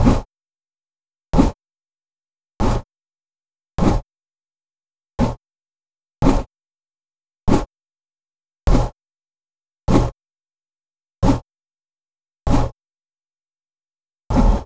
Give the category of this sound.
Sound effects > Objects / House appliances